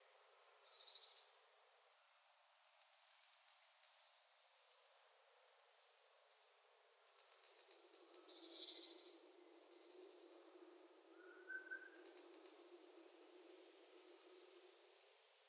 Sound effects > Animals
Birds singing in the forest